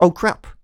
Speech > Solo speech
Surprised - Oh crap
dialogue, FR-AV2, Human, Male, Man, Mid-20s, Neumann, NPC, oneshot, singletake, Single-take, surprised, talk, Tascam, U67, Video-game, Vocal, voice, Voice-acting